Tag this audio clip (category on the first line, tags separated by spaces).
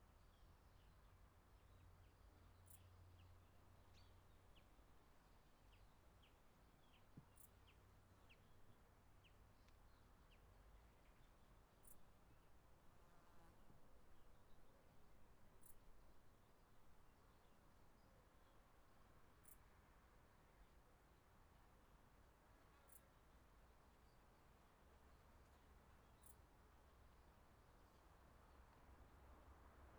Soundscapes > Nature
birds dogs europe field-recording nature rural slovakia